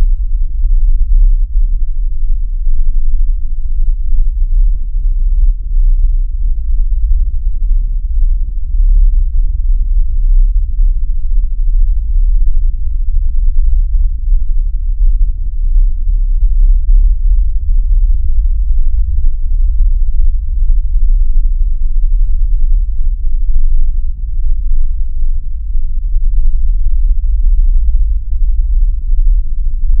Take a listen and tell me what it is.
Sound effects > Experimental
Space Flight 13
"It is not difficult to imagine why the crew thinks the ship is haunted from my vantage aboard." For this sound effect I used a Zoom H4n multitrack recorder to capture ambient sounds in my home. I then imported those audio files into Audacity where I layered them and added effects in order to tease out this final piece.
groaning, rattling